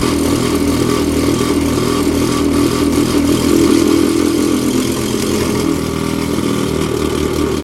Sound effects > Objects / House appliances
Malfunctioning bathroom ceiling fan Recorded using Voice Memos on iPhone Recorded January 9, 2021 in an apartment in South Philadelphia